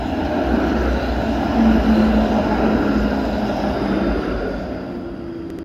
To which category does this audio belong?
Soundscapes > Urban